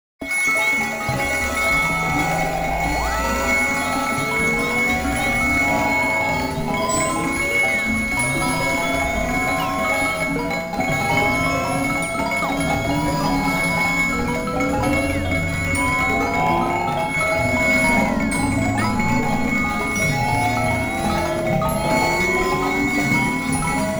Soundscapes > Synthetic / Artificial
Grain Baby Mobile 3
These are my first experiments with a granulator. I believe there will be more volumes. Sounds are suitable for cinematic, horror, sci-fi film and video game design.
effects, free, noise, packs, sfx, sound